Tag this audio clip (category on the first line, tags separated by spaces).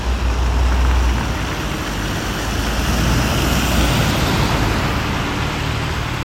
Soundscapes > Urban
bus public transportation